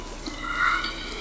Sound effects > Objects / House appliances
weird blow and whistle mouth foley-006
bonk, clunk, drill, fieldrecording, foley, foundobject, fx, glass, hit, industrial, mechanical, metal, natural, object, oneshot, perc, percussion, sfx, stab